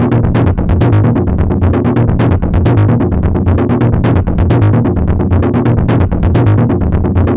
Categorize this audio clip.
Instrument samples > Synths / Electronic